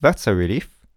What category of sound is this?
Speech > Solo speech